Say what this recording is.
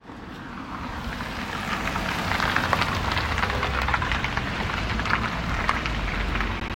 Soundscapes > Urban
Car passing Recording 37
Road, Cars, Transport